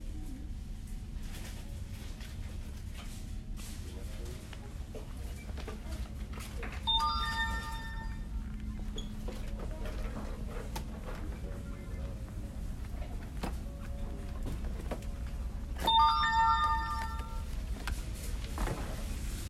Sound effects > Other mechanisms, engines, machines
Sound of electronic hand scanner "ping" utilized by employee at Target. Kind of a small magical wand sound. General store ambiance and scuffling around can be heard as well.